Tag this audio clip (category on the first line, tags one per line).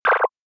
Sound effects > Electronic / Design
abstract
beep
bleep
computer
digital
electronic
glitch
sci-fi
sfx
sound-design
sounddesign